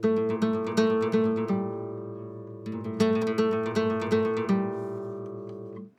Music > Solo instrument
Short guitar run of a busker in Sevilla, recorded on a phone. Cleaned-up in Bitwig and tuned to D